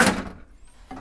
Sound effects > Other mechanisms, engines, machines
metal shop foley -066
fx, strike, bop, foley, crackle, thud, sound, percussion, metal, bang, tools, perc, bam, pop, tink, shop, knock, sfx, little, wood, oneshot, boom, rustle